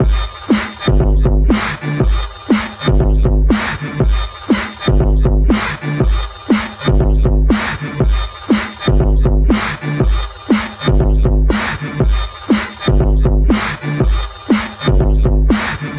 Music > Solo percussion
120 606Distort Loop 01
Loop, Synth, Bass, Kit, Vintage, DrumMachine, music, Analog, Drum, Modified, 606, Mod, Electronic